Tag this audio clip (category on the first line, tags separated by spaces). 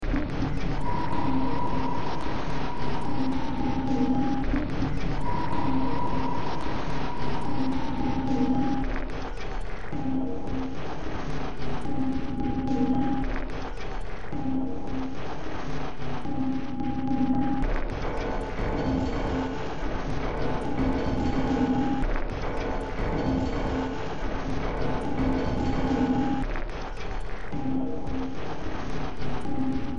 Music > Multiple instruments
Games Horror Underground Noise Sci-fi Industrial Ambient Cyberpunk Soundtrack